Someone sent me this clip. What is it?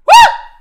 Sound effects > Human sounds and actions
Scream high pitch
High pitch very short scream
fear, fearful, scream, yell